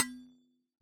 Sound effects > Objects / House appliances
Solid coffee thermos-021
percusive, recording, sampling